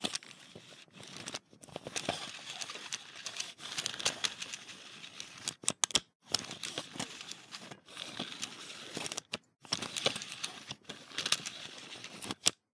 Sound effects > Objects / House appliances
Pulling and retracting an old tape measure.